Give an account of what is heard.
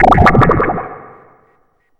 Instrument samples > Synths / Electronic
Benjolon 1 shot44
1SHOT, BENJOLIN, CHIRP, DRUM, MODULAR, NOSIE, SYNTH